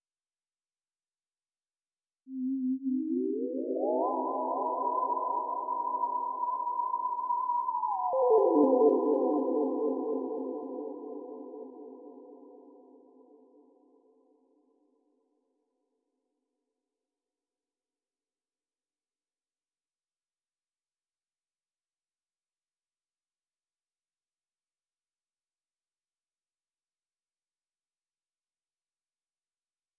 Sound effects > Other
Magic swoosh but more alien than magic tbh. Made using Omnisphere in Ableton 11.
Ableton, Magic